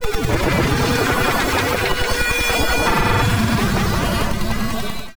Sound effects > Electronic / Design
Optical Theremin 6 Osc Destroyed-009
Handmadeelectronic, Digital, Robotic, DIY, Theremin, Infiltrator